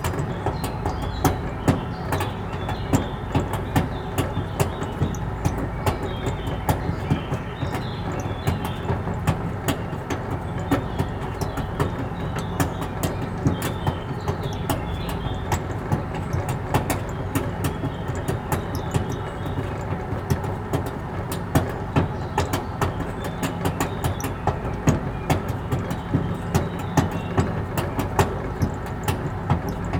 Soundscapes > Urban
Subject : Date : 2025 05/May 19 around 13h Location : Albi 81000 Tarn Occitanie France Weather : Hardware : Zoom H2n on a "gooseneck/clamp" combo for action cams. Processing : Trim and normalised.